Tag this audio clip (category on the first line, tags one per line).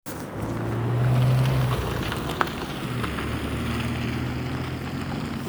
Soundscapes > Urban
Car
vehicle